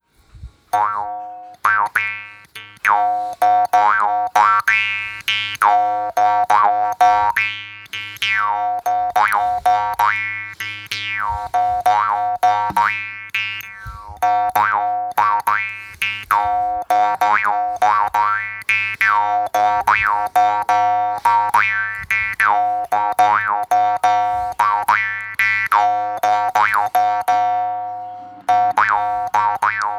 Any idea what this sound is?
Instrument samples > Other
Jaw HARP / trompe / arpa de boca /
arpa de boca/trompe grabada con mkh416 y sounddevices 722 ----------------------------------------------------------------------------------------------------- Jaw harp recorded with MKH416 and SoundDevices 722